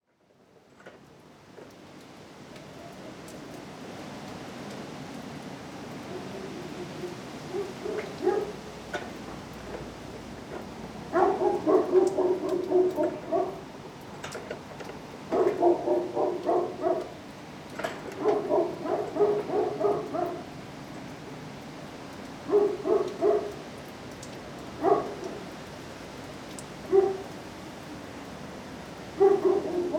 Soundscapes > Nature
An afternoon recording from my back garden.